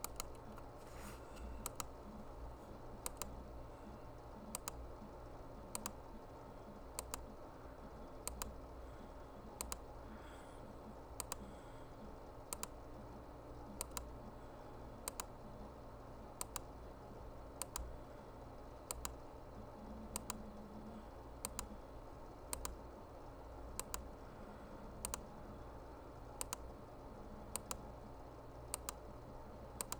Sound effects > Objects / House appliances
CMPTKey-Blue Snowball Microphone Logitech M187 Mouse, Clicking Nicholas Judy TDC
A Logitech M187 computer mouse clicking.